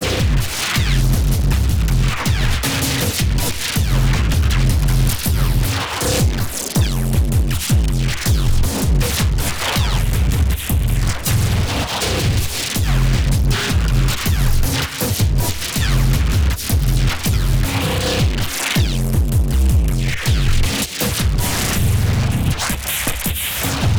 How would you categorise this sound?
Music > Multiple instruments